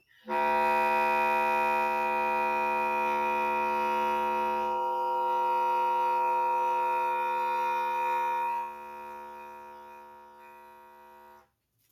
Instrument samples > Wind
Bass Clarinet E2
Recorded using a laptop microphone. A real Bass Clarinet sound.
Clarinet, Sustained